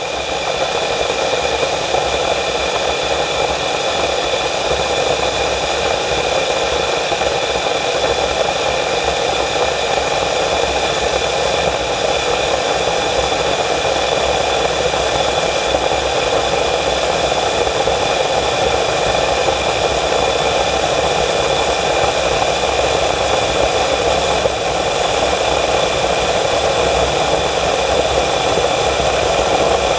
Sound effects > Objects / House appliances
Boiling Water 02
A Rode M5 microphone was placed above an electric kettle containing one liter of water, which was turned on and allowed to begin boiling. The audio was captured using a Zoom H4N multitrack recorder. Then normalized with Audacity.
boiling, electric, hot, kettle, steam, zoom-h4n